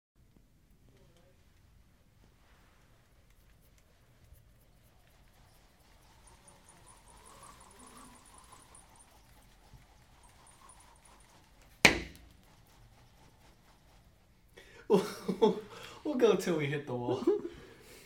Sound effects > Other
Wind + Gunshot
Foley sound made by whipping a computer charger in circles (Not a real gunshot, just a charger hitting a wall after we whipped it around)
Gunshot, Wind-blowing, Whipping-wind